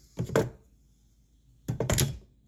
Sound effects > Other mechanisms, engines, machines
Removing and replacing a slide tray into projector.